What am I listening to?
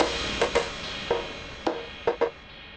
Sound effects > Electronic / Design
Impact Percs with Bass and fx-002
bash, bass, brooding, cinamatic, combination, crunch, deep, explode, explosion, foreboding, fx, hit, impact, looming, low, mulit, ominous, oneshot, perc, percussion, sfx, smash, theatrical